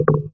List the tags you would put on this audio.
Sound effects > Electronic / Design
ui
interface
game

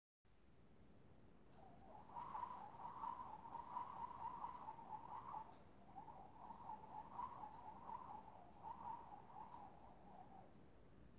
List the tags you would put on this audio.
Sound effects > Human sounds and actions
man
swing
rope
action

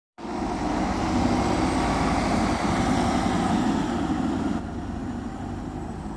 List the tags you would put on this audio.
Sound effects > Vehicles
hervanta
bus
finland

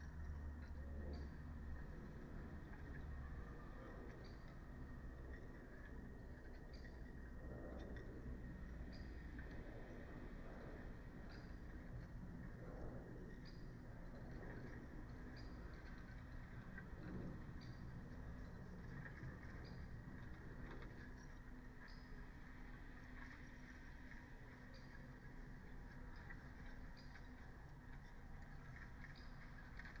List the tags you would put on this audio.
Soundscapes > Nature
weather-data
artistic-intervention
nature
Dendrophone
raspberry-pi
field-recording
natural-soundscape
alice-holt-forest
phenological-recording
soundscape
sound-installation
modified-soundscape
data-to-sound

